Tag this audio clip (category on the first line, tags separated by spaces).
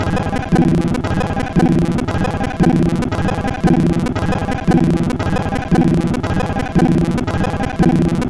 Instrument samples > Percussion
Underground Ambient Alien Dark Loop Drum Packs Weird Samples Loopable